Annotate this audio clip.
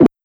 Sound effects > Human sounds and actions
LoFiFootsteps Stone Walking-03
Shoes on stone and rocks, walking. Lo-fi. Foley emulation using wavetable synthesis.
steps, stone, lofi, synth, jogging, jog, rocks, walking, walk, footstep